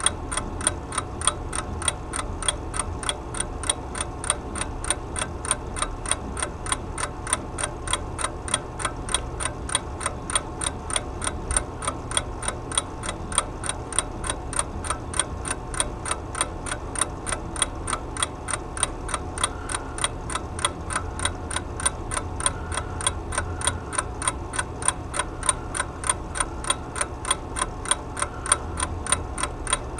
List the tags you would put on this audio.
Sound effects > Objects / House appliances
clock
wind-up
tick
alarm
Blue-Snowball
Blue-brand

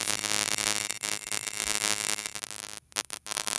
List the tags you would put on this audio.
Sound effects > Electronic / Design
glitch
noise
static

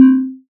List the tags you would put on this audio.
Instrument samples > Synths / Electronic
bass
fm-synthesis
additive-synthesis

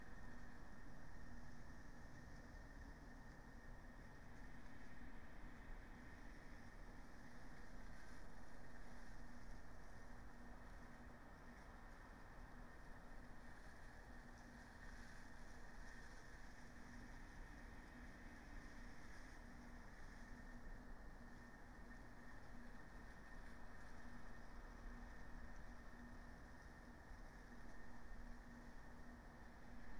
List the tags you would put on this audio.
Soundscapes > Nature
field-recording
sound-installation
natural-soundscape
artistic-intervention
raspberry-pi
soundscape
weather-data
Dendrophone
nature
phenological-recording
alice-holt-forest
modified-soundscape
data-to-sound

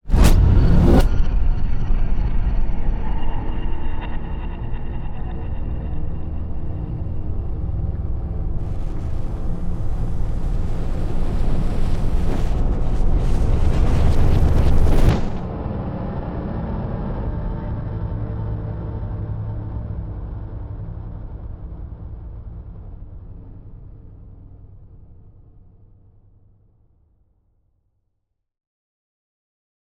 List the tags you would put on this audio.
Sound effects > Other
bass
boom
cinematic
deep
effect
epic
explosion
game
hit
impact
implosion
indent
industrial
metal
movement
reveal
riser
stinger
sub
sweep
tension
trailer
transition
video
whoosh

